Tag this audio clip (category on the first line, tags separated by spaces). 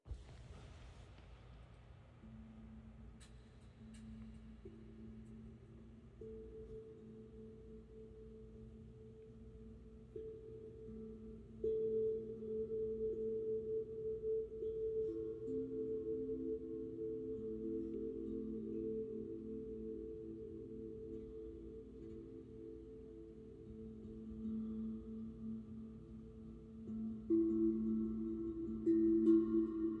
Music > Solo percussion
Ambient Autumn Chill Dreamscape Fall Handpan Night Relaxing